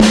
Instrument samples > Percussion
jazzsnare attack 1
I don't like it. The attack is delayed. Not the mainsnare. tags: jazz jazzware drumroll snareroll snare-roll snare attack trigger drum timpani drums bongos djembe conga frame-drum tabla bodhrán talking drum cajón high-drum rototom kettledrum war-drum field-drum parade-drum orchestral-drum marching-drum
attack, bodhrn, bongos, cajn, conga, djembe, drum, drumroll, drums, field-drum, frame-drum, high-drum, jazz, jazzware, kettledrum, marching-drum, orchestral-drum, parade-drum, rototom, snare, snare-roll, snareroll, tabla, talking, timpani, trigger, war-drum